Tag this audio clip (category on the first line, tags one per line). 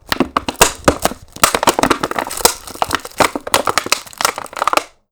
Sound effects > Objects / House appliances
Blue-brand
Blue-Snowball
bottle
crush
foley
plastic
soda